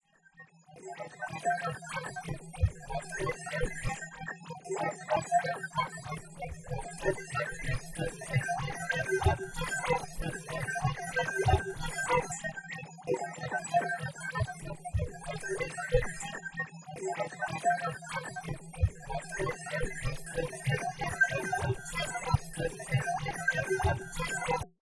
Sound effects > Experimental
Alien Space Waves !!Cheesy Alert!!
Oh no, the aliens are using their space waves on us!!! It is making the thinking the difficult. How will we critical thinking utilitate when they are beaming it at us. Officially the cheesiest sound I have ever produced.
scifi, crazy, cheesy, helpme, joke